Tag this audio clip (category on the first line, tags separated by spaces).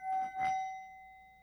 Instrument samples > Percussion
bowl Instrument Ring singing